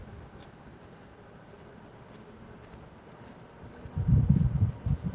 Sound effects > Vehicles
tram approaching from distance endWind
Tram approaching with a slowly accelerating speed, from an approximate distance of 100 meters. Recorded from an elevated position from at least 100 meters away, along the tram tracks, using a Samsung Galaxy S20+ default device microphone. The ending is distorted by heavy wind, the recording includes sounds of walking, of passing people. TRAM: ForCity Smart Artic X34